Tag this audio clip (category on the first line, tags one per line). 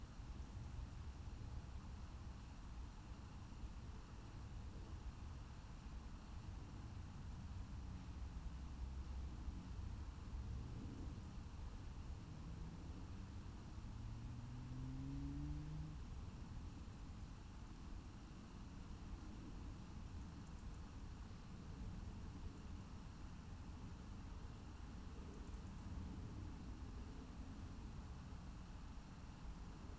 Soundscapes > Nature
nature
phenological-recording
raspberry-pi